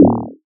Instrument samples > Synths / Electronic
BWOW 2 Eb
bass, additive-synthesis, fm-synthesis